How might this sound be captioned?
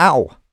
Sound effects > Human sounds and actions
Hurt - Ow
pain, FR-AV2, Vocal, dialogue, Video-game, Tascam, U67, Hurt, Single-take, Voice-acting, singletake, Neumann, Mid-20s, Man, AW, voice, oneshot, talk, Male, Human, NPC